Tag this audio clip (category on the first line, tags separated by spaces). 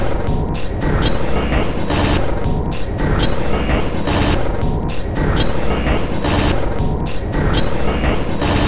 Instrument samples > Percussion
Packs Weird Alien Samples Ambient Loop Loopable Dark Underground Soundtrack